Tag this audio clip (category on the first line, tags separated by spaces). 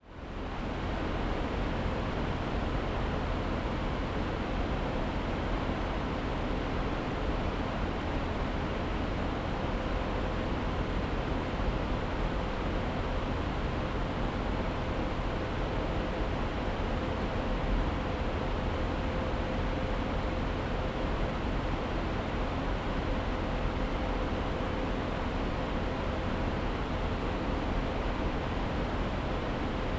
Sound effects > Other mechanisms, engines, machines
airflow household machine foley humming fan home hum